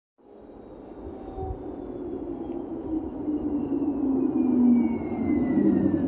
Soundscapes > Urban
final tram 12
finland, hervanta, tram